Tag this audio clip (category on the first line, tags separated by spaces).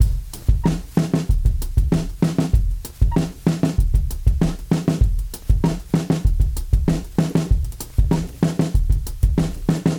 Music > Solo percussion
DrumLoop Dusty Vinyl Breakbeat Acoustic Drums Break Drum 96BPM Vintage Drum-Set Lo-Fi